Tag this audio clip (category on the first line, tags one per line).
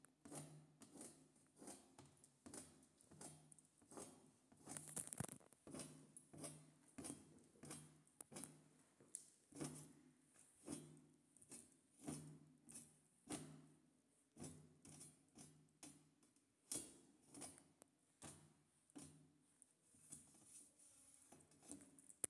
Sound effects > Experimental
Metal
Scratch